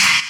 Instrument samples > Percussion
China 1 - 19 inches Zildjian Z3 semilong
metallic, crack, low-pitchedmetal, Istanbul, clang, Soultone, Sabian, smash, crash, cymbal, bang, multi-China, sinocymbal, Paiste, sinocrash, spock, clash, Avedis, Zultan, Meinl, Zildjian, multicrash, Stagg, China, crunch, shimmer, polycrash